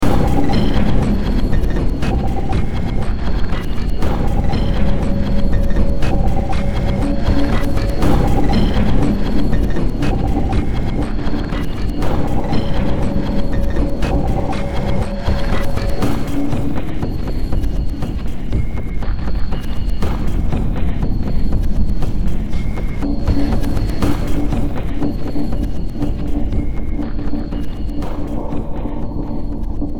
Music > Multiple instruments
Demo Track #2954 (Industraumatic)
Games, Noise, Ambient, Industrial, Sci-fi, Underground, Horror, Soundtrack, Cyberpunk